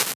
Instrument samples > Synths / Electronic
databent closed hihat 2
databending, glitch, hihat